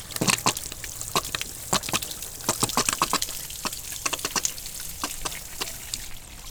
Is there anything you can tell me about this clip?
Sound effects > Objects / House appliances
Outdoor Hose squirting